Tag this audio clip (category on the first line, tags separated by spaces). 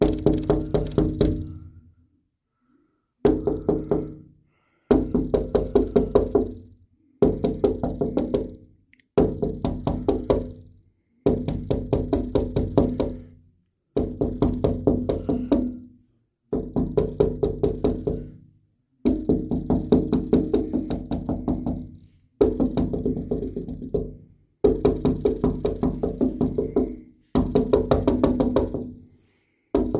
Sound effects > Objects / House appliances
knock glass Phone-recording window